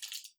Instrument samples > Percussion
Cellotape Percussion One Shot24
texture, ambient, electronic, organic, cellotape, DIY, sound, layering, cinematic, creative, percussion, pack, adhesive, sounds, shots, IDM, design, lo-fi, experimental, drum, foley, glitch, found, sample, unique, one, samples, shot, tape